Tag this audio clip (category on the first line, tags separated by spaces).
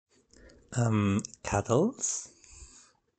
Speech > Solo speech
cuddles male question voice